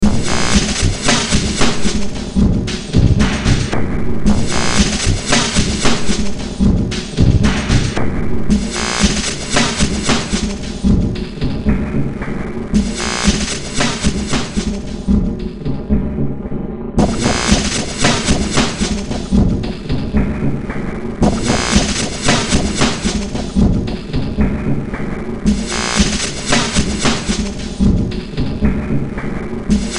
Music > Multiple instruments
Demo Track #4055 (Industraumatic)
Ambient, Games, Noise, Sci-fi, Soundtrack, Underground